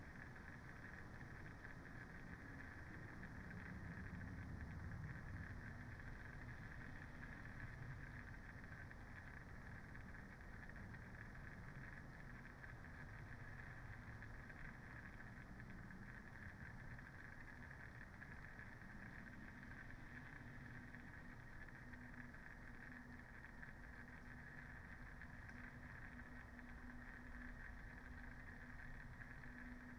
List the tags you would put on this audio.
Soundscapes > Nature
alice-holt-forest
Dendrophone
field-recording
weather-data
nature
modified-soundscape
artistic-intervention
raspberry-pi
phenological-recording
sound-installation
natural-soundscape
soundscape
data-to-sound

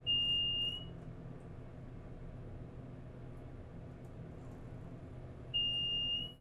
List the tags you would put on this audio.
Sound effects > Other mechanisms, engines, machines
beep
elevator
mechanical

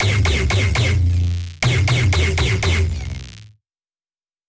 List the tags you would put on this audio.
Sound effects > Other
automatic
electronic
futuristic
sci-fi
scifi
gun
rifle
semi-automatic
weapon
pistol

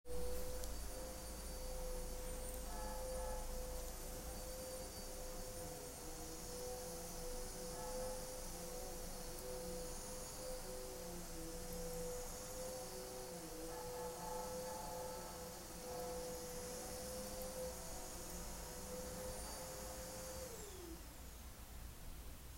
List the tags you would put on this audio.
Soundscapes > Indoors
vaccum
sound-effect
ambiance
office

